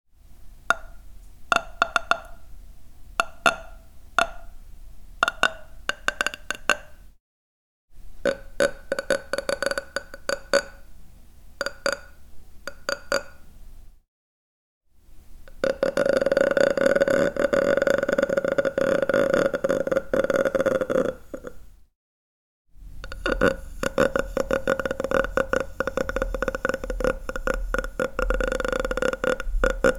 Sound effects > Human sounds and actions
death rattle - sound samples
attempted some "death rattle" sounds. there's some slight background noise, sorry!
horror, horror-fx, horror-effects, rattle, gurgle, death